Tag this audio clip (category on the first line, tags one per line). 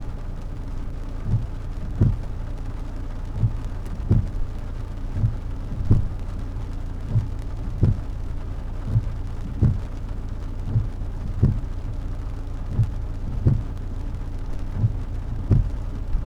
Soundscapes > Nature
autumn
car
field-recording
glass
nature
rain
raining
water
weather
window
windscreen
windshield
wipers